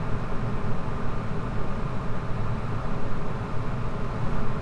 Soundscapes > Urban
Rooftop Vent Fan (Seamless Loop)
Seamless loop of a vent or air conditioner on a Finnish rooftop.
Fan, Loop, Sound, Spinning, Vent